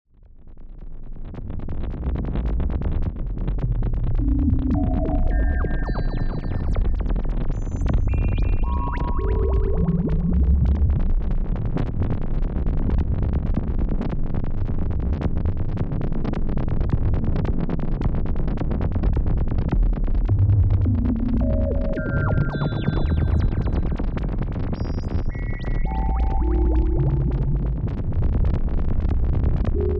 Soundscapes > Synthetic / Artificial
Deep Territory Exploration
More rhythmic lasers and otherworldly sounds, that seems to be echoes of a technologically advanced future intelligence. Beast Mode once again shows its sonic flexibility, with these perfect Sci-Fi soundscapes.